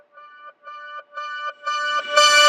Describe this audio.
Sound effects > Electronic / Design
STABBI ONE REVERSE
A reversed synth stab. One of two.
reverse, stab, synth